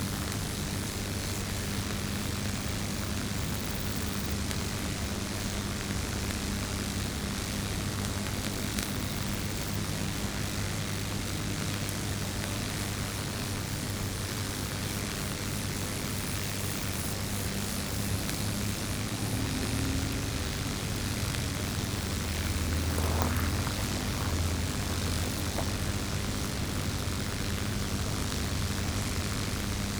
Soundscapes > Other
Field recording taken beneath the towers of a power plant adjacent to its dam. The foreground is the crackling sound of electricity passing through the cables, surrounded by the sounds of nature: grasshoppers, cicadas, crickets, and a few cars in the distance.